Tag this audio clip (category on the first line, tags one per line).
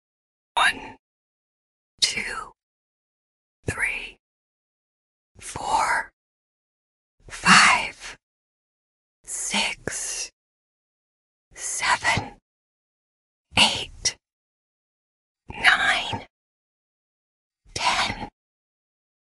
Speech > Solo speech
voice
english
female
whisper
number
counting
talk
speak
vocal